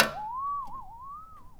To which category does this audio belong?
Sound effects > Other mechanisms, engines, machines